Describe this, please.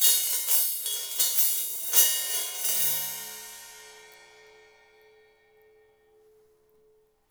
Music > Solo instrument
Zildjian Sizzle Chain 16inch Crash-003
Drums, GONG, Crash, Perc, Hat, Drum, Metal, Percussion, Ride, Sabian, FX, Cymbal, Custom, Paiste, Oneshot, Cymbals, Kit